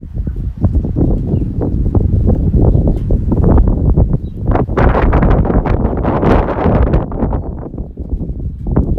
Soundscapes > Nature
Wind sound pp42018scn09
This was recorded outside.
Sounds, Day, Windy, Natural, Nature, Relax